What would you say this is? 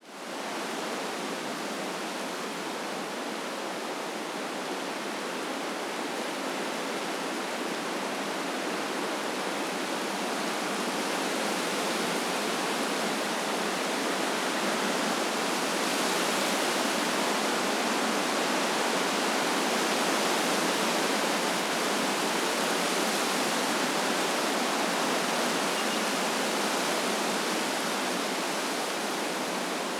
Soundscapes > Nature
Wind gusts building and declining in a grove of Eucalyptus trees on the lee side of a hill. Mic pointed up at the canopy and shielded from the wind by a tree and my body.